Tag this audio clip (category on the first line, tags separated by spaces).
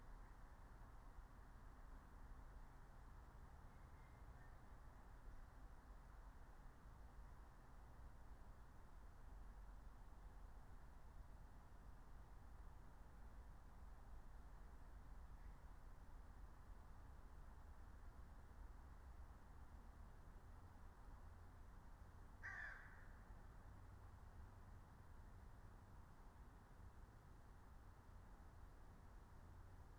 Soundscapes > Nature
meadow nature phenological-recording alice-holt-forest natural-soundscape field-recording raspberry-pi soundscape